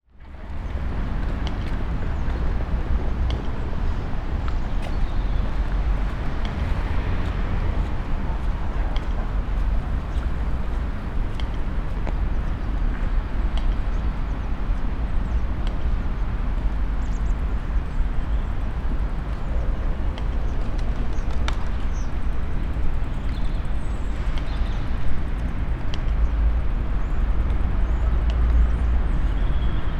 Soundscapes > Urban
City of Ghent 1
Soundscape of the city of Ghent. December 2025. Recorded with Stogie microphones in a Zoom F3.
city, belgium, ambiance, ghent